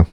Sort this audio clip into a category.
Sound effects > Human sounds and actions